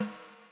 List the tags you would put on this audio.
Music > Solo percussion
fx
drum
processed
ludwig
oneshot
rimshot
crack
roll
drumkit
brass
rim
snareroll
drums
hits
realdrum
kit
perc
flam
snares
beat
hit
acoustic
rimshots
reverb
snaredrum
realdrums
snare
sfx
percussion

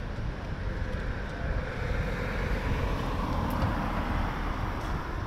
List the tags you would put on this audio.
Sound effects > Vehicles
Field-recording
Finland
Car

